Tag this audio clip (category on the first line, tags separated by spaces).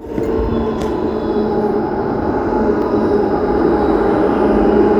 Sound effects > Vehicles
vehicle transportation tramway